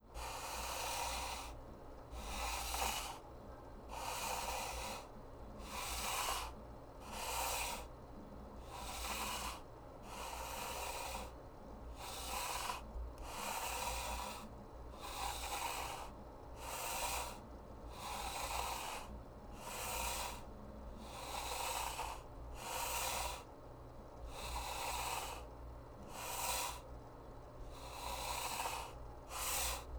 Sound effects > Objects / House appliances
CMPTKey-Blue Snowball Microphone Logitech M185 Mouse, Sliding Nicholas Judy TDC
A Logitech M185 computer mouse sliding.
logitech-m185, computer, foley, logitech, Blue-Snowball, Blue-brand, mouse, slide